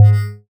Instrument samples > Synths / Electronic
BUZZBASS 2 Ab
additive-synthesis, fm-synthesis